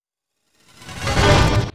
Sound effects > Electronic / Design
Rewind Time (8 bit)
Could also work for teleportation, activating an energy shield, turning on a computer or another device, etc.
but-can-you-do-this, cancel, Dylan-Kelk, go-back-in-time, Lux-Aeterna-Audio, magic, magic-spell, reverse-time, rewind, rewind-time, rewind-time-spell, spell, teleport, teleportation, teleportation-magic, teleport-spell, temporal-magic, time-magic, time-spell, undo-action